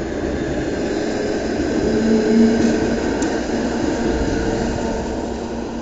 Soundscapes > Urban
Passing Tram 13
city, field-recording, outside, street, traffic, tram, urban